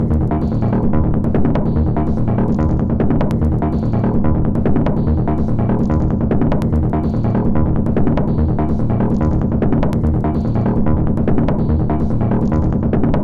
Instrument samples > Percussion
This 145bpm Drum Loop is good for composing Industrial/Electronic/Ambient songs or using as soundtrack to a sci-fi/suspense/horror indie game or short film.
Ambient, Samples, Weird, Drum, Packs, Soundtrack, Alien, Loopable, Dark, Industrial, Loop, Underground